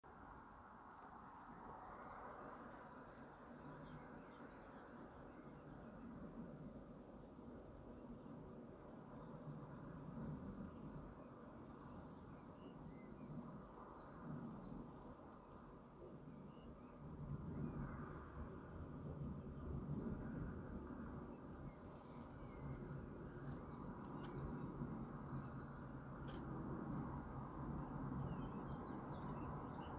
Sound effects > Animals
33s of birds chirping